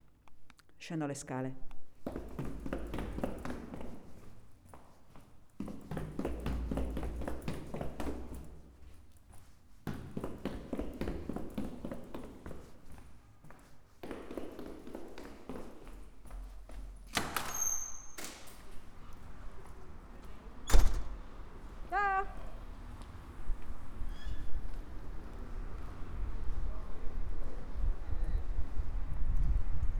Sound effects > Human sounds and actions
Walking down the stairs
This recording captures the sound of a female walking down a set of stairs indoors. You can clearly hear the footsteps echoing on each step, along with the natural reverb of the stairwell. At the beginning, an Italian female voice says, “Scendo le scale” (“I’m going down the stairs”), followed by a friendly “Ciao!” (“Hello/Bye!”) at the end. This audio is ideal for use in film, video games, or projects needing authentic indoor movement and spoken Italian phrases.
ambience, echo, footsteps, indoors, Italian, male, speech, stairs, voice, walking